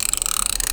Sound effects > Other mechanisms, engines, machines
MECHRtch-Blue Snowball Microphone, CU Music Box, Single Wind Nicholas Judy TDC
A single music box wind.
Blue-Snowball, Blue-brand, music-box, foley, wind